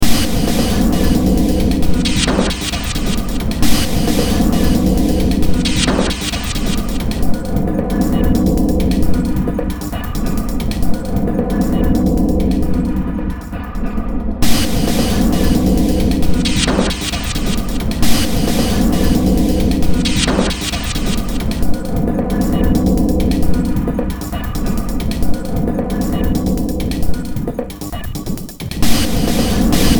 Music > Multiple instruments
Short Track #3438 (Industraumatic)
Ambient, Games, Noise, Horror, Underground, Cyberpunk, Soundtrack, Industrial, Sci-fi